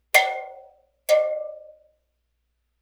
Sound effects > Objects / House appliances
aluminum can foley-022
alumminum can foley metal tap scrape water sfx fx household
fx, foley, household, alumminum, tap, sfx, can, scrape, metal, water